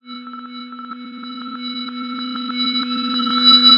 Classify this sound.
Instrument samples > Synths / Electronic